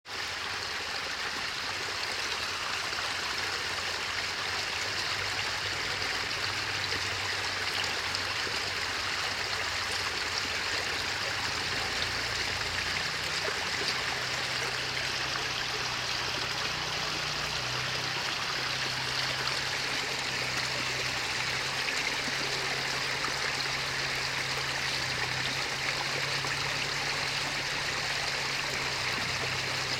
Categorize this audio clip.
Soundscapes > Nature